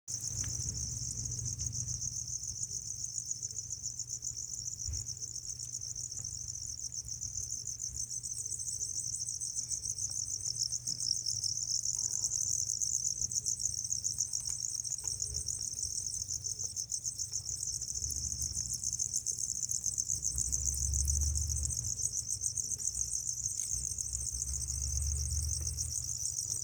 Sound effects > Animals
Insects - House Cricket; Many Crickets Chirping, Close Perspective
The sound of a few boxfuls of crickets were recorded at Dennis Sports Shop, where the crickets are sold as fishing bait just outside Toombs Central, GA.